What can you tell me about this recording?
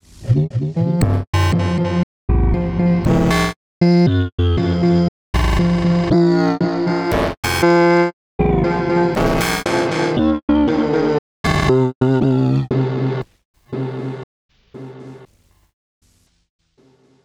Speech > Processed / Synthetic
wrecked vox 17
A heavily processed vocal effect recorded using an SM Beta 57a microphone into Reaper, Processed with a myriad of vst effects including Shaperbox, Infiltrator, Fab Filter, etc